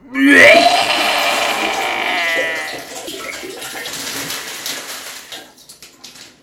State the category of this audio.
Sound effects > Human sounds and actions